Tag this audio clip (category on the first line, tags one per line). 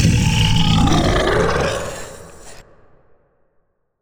Sound effects > Experimental
Sfx
Creature
otherworldly
dripping
Alien
howl
growl
bite
Monster
devil
grotesque
weird
mouth
zombie
gross
demon
fx
snarl